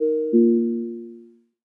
Sound effects > Electronic / Design
Doorbell Synth
A sweet lil ringtone/chime made on a Microkorg S, processed in Pro Tools. This one sounds like a doorbell.
bleep, game, synth